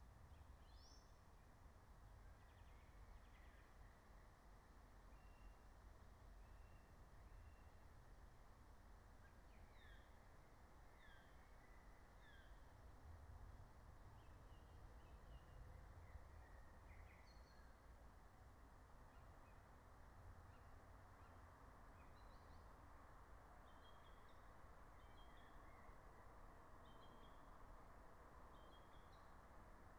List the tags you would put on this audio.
Soundscapes > Nature
natural-soundscape meadow nature alice-holt-forest phenological-recording field-recording soundscape raspberry-pi

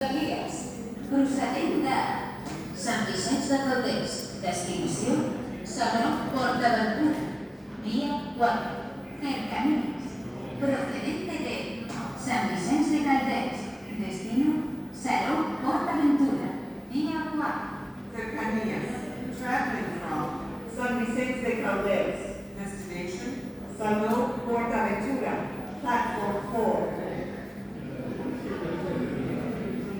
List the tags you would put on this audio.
Soundscapes > Urban
announcement announcer field-recording loudspeaker platform Spain station Tarragona train train-platform train-station

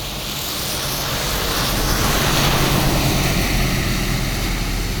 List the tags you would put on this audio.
Sound effects > Vehicles
transportation bus vehicle

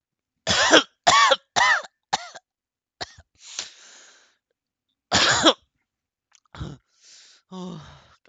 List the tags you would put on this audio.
Speech > Conversation / Crowd
bugs coughing 2001 dave

